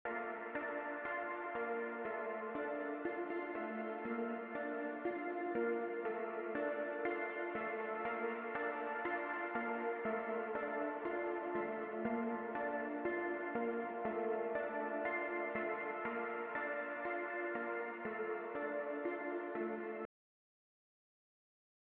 Music > Solo instrument
Space synth melody
A short melody with a simple synth i created on vital digital synthesizer. It's great for idm tracks
IDM, Keys